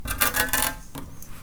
Sound effects > Other mechanisms, engines, machines
Handsaw Tooth Teeth Metal Foley 13
vibration, tool, metal, foley, hit, twangy, plank, household, shop, vibe, fx, metallic, perc, saw, percussion, smack, sfx, handsaw, twang